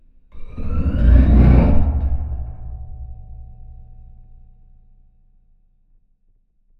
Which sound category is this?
Sound effects > Other